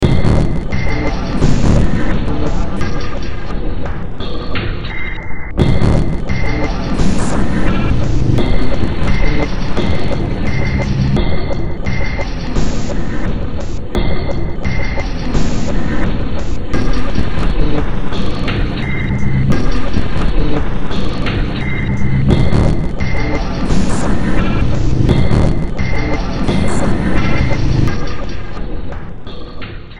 Music > Multiple instruments
Demo Track #3553 (Industraumatic)
Noise, Ambient